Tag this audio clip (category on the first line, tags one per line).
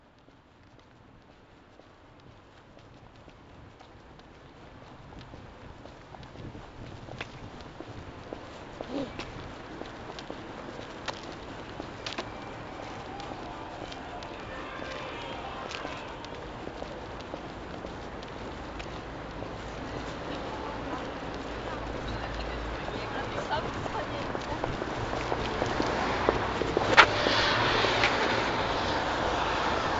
Soundscapes > Urban
cars
walking
traffic